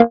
Instrument samples > Synths / Electronic
TAXXONLEAD 8 Bb
additive-synthesis, bass, fm-synthesis